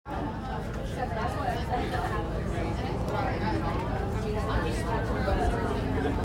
Soundscapes > Indoors
People-Talking-Ambience
Group of people in public space talking simultaneously. Can create the illusion of being in a busy public setting.